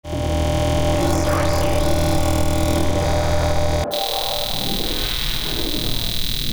Sound effects > Electronic / Design
Smooth Resonance Bass Glitch Drone Sequence
Abstract, Alien, Analog, Automata, Buzz, Creature, Creatures, Digital, Droid, Drone, Experimental, FX, Glitch, Mechanical, Neurosis, Noise, Otherworldly, Robotic, Spacey, Synthesis, Trippin, Trippy